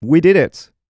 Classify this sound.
Speech > Solo speech